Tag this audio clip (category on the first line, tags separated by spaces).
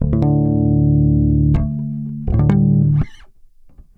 Instrument samples > String
bass blues charvel electric funk fx loop loops mellow oneshots pluck plucked riffs rock slide